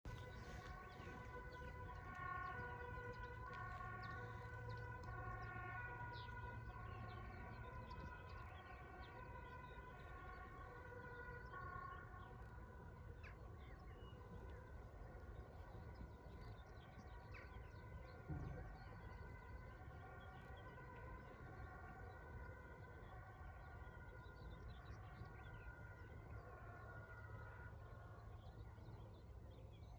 Soundscapes > Urban
Distant Emergency Vehicle Siren Netherlands
holland, fire-truck, car, police-car, cityscape, sirens, alarm, siren, emergency, netherlands, police, ambulance, field-recording, firetruck, emergency-vehicle, police-wagon